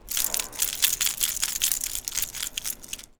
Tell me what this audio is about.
Sound effects > Objects / House appliances
Keys Jingling Recording
Jingle, Keys, Rattle